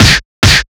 Instrument samples > Percussion
Phonk BeatBox Snare-2
A snare made with my mouth, recorded with my headphone's microphone Layerd samples from FLstudio original sample pack. Processed with Waveshaper, ZL EQ, ERA 6 De-Esser Pro.